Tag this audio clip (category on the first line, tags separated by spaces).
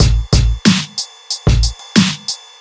Music > Other
beat rhythmic percussions drums drumloop groovy loop hiphop